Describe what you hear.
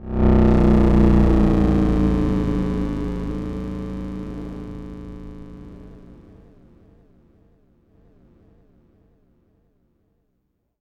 Sound effects > Experimental
Analog Bass, Sweeps, and FX-055
fx, pad, sample, robotic, sweep, analogue, complex, effect, alien, scifi, mechanical, oneshot, bassy, electro, retro, snythesizer, weird, analog, bass, trippy, korg, machine, robot, synth, basses, dark, sfx